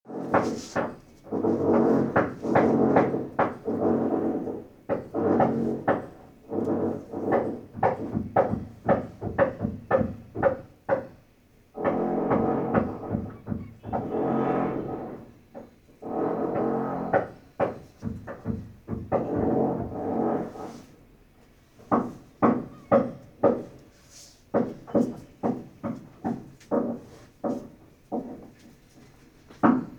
Sound effects > Other mechanisms, engines, machines
Heavy hammering and drilling works in apt. zone 2
Three neighboring apartments decided to remodel simultaneously, and far from being angry, I decided to record a few minutes of the torture and share it with all of you, sonic nerds of the world. Perhaps the annoyance that will accompany me for a month will be useful to someone who needs this for a project. The package includes three zones: the hammering zone, the drilling zone, and an intermediate zone where both fight equally to drive the other neighbors crazy. Enjoy the horror.
constructing, demolition, drilling, hammering, remodel, tools